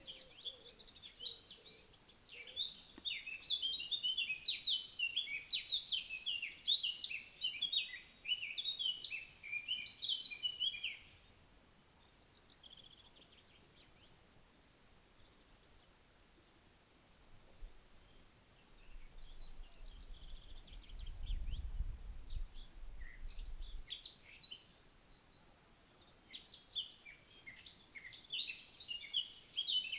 Soundscapes > Nature
Bird Chiffchaff ZipZalp Cevennes
The bird Chiffchaff (nearly solo) in the Cevvennes.
binaural-stereo, birdsong, Chiffchaff, field-recording